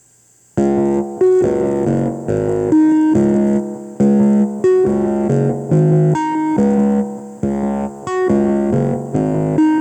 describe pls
Music > Solo instrument
Dub reggae bassline made with JT-Mini synthesizer
bassline, dub, dubreggae, reggae, synthbass